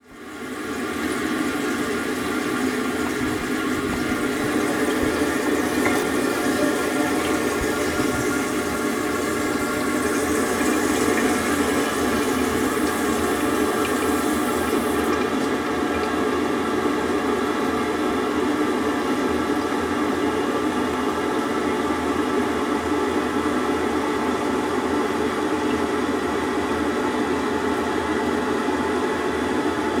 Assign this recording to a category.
Sound effects > Objects / House appliances